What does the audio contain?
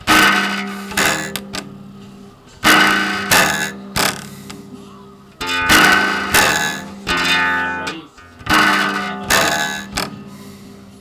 Instrument samples > String
Bad chords
sound of a detuned bass guitar
bass, chords, disharmonious